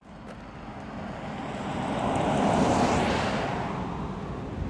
Soundscapes > Urban
Car driving by recorded in an urban area.
car, traffic, vehicle